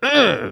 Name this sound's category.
Speech > Solo speech